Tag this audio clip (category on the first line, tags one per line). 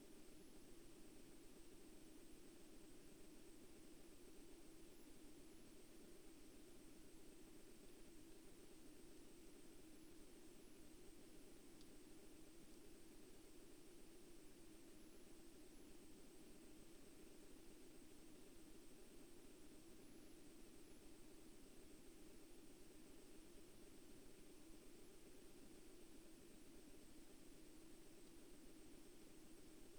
Soundscapes > Nature
data-to-sound
field-recording
phenological-recording
weather-data
Dendrophone
raspberry-pi
nature
alice-holt-forest
soundscape
natural-soundscape
sound-installation
artistic-intervention
modified-soundscape